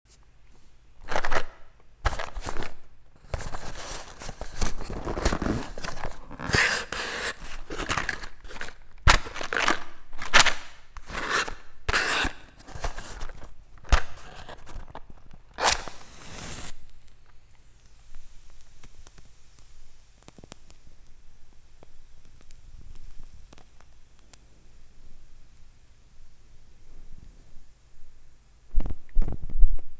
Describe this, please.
Sound effects > Objects / House appliances
Yo these matches sounds are LIT (reverb)
A series of lighting a wooden match, whipping it or blowing it out, and quenching it into a small bowl of water. I included lighting a candle twice for good measure. This is the wet, with-reverb sound.
blow-out, candle, lit, matches, matchstick, pyromania, wooden-matches